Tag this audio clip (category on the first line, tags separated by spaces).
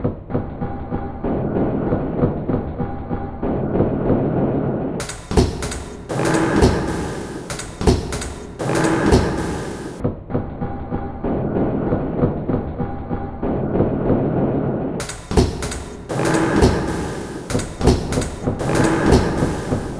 Instrument samples > Percussion
Alien Ambient Dark Drum Loop Packs Soundtrack Weird